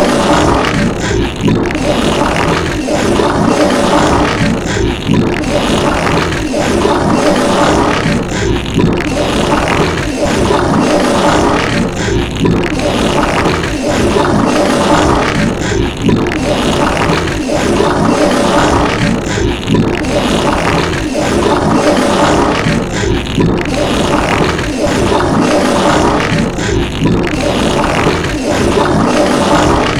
Sound effects > Human sounds and actions
burpfart biomachine 1 - premix 2
diarrhea, bubble-up, cut-the-cheese, burp, eruct, expel, hiccup, regurgitate, air-biscuit, break-wind, gurgle, flatulate, let-one-slip, death-metal, toot, cesspit, pass-gas, one, discharge, exhale, vomit, poot, fart, rip, belch, rift